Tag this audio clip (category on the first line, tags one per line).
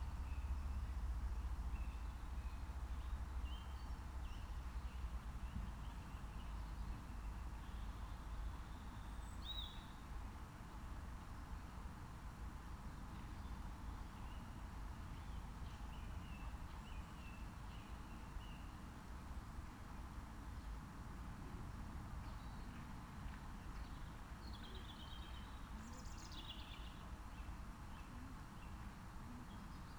Soundscapes > Nature
raspberry-pi
natural-soundscape
soundscape
phenological-recording
nature
meadow
field-recording
alice-holt-forest